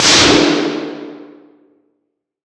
Soundscapes > Other
I&R 2x5 concave celining room A51
Subject : An Impulse and response (not just the response.) of the very top room at my old home in Esperaza, A almost 2x5m and 2m high but the ceiling is convexe / curves across the length. Date YMD : 2025 July 12 Daytime Location : Espéraza 11260 Aude France. Hardware : Samsung A51 phone Weather : Processing : Trimmed in Audacity fade in/out and normalized.